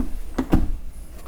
Sound effects > Objects / House appliances
knife and metal beam vibrations clicks dings and sfx-123
Clang, Vibration, Wobble